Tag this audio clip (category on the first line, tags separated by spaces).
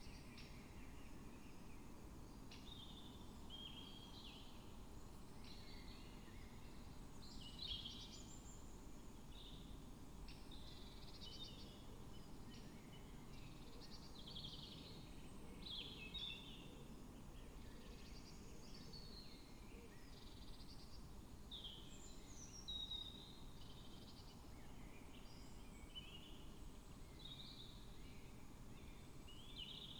Soundscapes > Nature
artistic-intervention natural-soundscape nature sound-installation alice-holt-forest modified-soundscape soundscape raspberry-pi data-to-sound Dendrophone field-recording weather-data phenological-recording